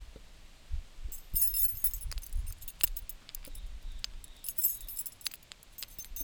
Soundscapes > Other
Handling keys - windy outdoors manipulación llaves en exterior a punto de llover recorded using ZOOM H6 recorder / XY microphones Lanús, Argentina, 2025
field-recording, keys, outdoors, wind